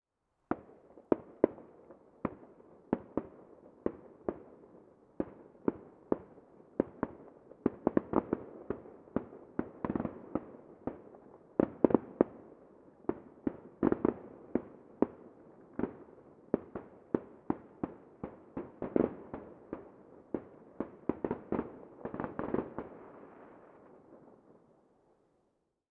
Soundscapes > Urban
2026 New Year Fireworks
Faraway perspective of the 2026 New Year's fireworks on the Cycladic island of Tinos. Some dogs can also be heard in the distance.
faraway, fireworks, new-years-eve, pyrotechnics